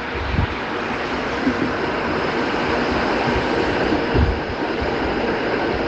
Sound effects > Vehicles
A tram approaching and passing by on a busy street / urban environment, recorded with a Samsung Galaxy S20+ default device microphone, from an elevated position near a busy urban road. TRAM: ForCity Smart Artic X34